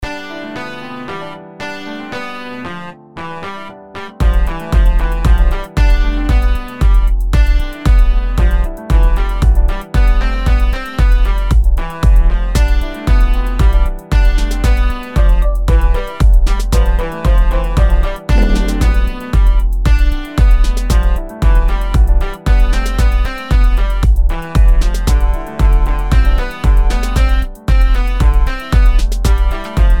Music > Multiple instruments
Young Guy loop
loop BGM. "This music made in FL Studio at [115 BPM] to convey a feeling of [a young guy character appear in a visual novel game] for example for [a break up scen or a comedy montage]."